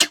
Sound effects > Electronic / Design
A short laser sound.